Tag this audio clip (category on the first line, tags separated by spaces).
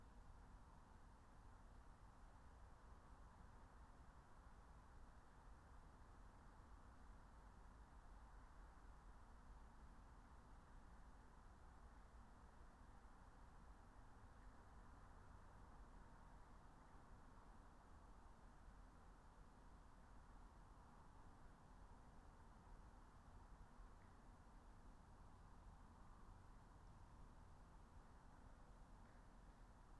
Soundscapes > Nature
phenological-recording nature soundscape natural-soundscape alice-holt-forest meadow raspberry-pi field-recording